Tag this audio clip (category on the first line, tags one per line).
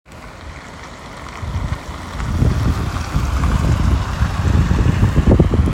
Sound effects > Vehicles
vehicle
car